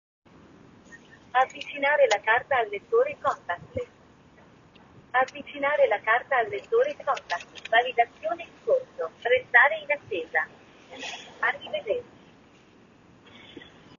Speech > Processed / Synthetic
Automated Italian Toll Booth Message – Female Voice, En Route to Rome (14 Seconds)
Recording of an automated female voice message in Italian, played at a tollbooth for cars. This was the last tollbooth before entering Rome, recorded on July 29, 2025, en route to Rome, Italy, on an iPhone 15 using Apple Voice Memos.
24kHz, ambiance, ambience, ambient, atmosphere, background, background-sound, calm, environment, field-recording, Foundsound, general-noise, iphone, Italian, Italy, mono, natural, nature, outdoor, Rome, short-clip, soft, soundscape, Tollbooth, Voice